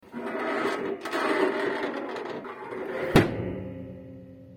Sound effects > Objects / House appliances
Quickly opening and closing the bunk of my squeaky dorm bed.